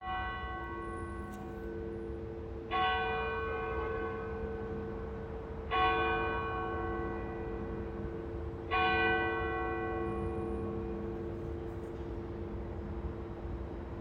Soundscapes > Urban
Church bells ringing in the middle of a city
bells, church, city, field-recording